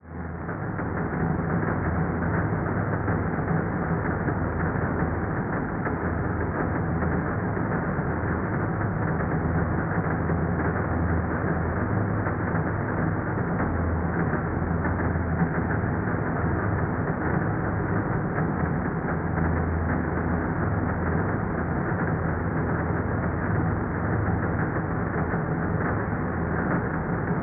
Soundscapes > Urban
IDM Atmosphare1
Synthed with PhasePlant Granular
Ambient, Atomsphare, IDM, Industry, Noise